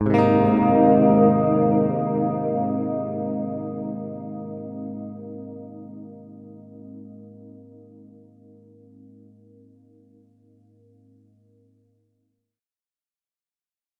Instrument samples > String
Baritone Guitar - G# Chord - Reverb
Simple chord played on my G4M electric baritone guitar that is tuned in C. Recorded with Dreadbox Raindrops effects pedal on Zoom AMS-24 audio interface (stereo).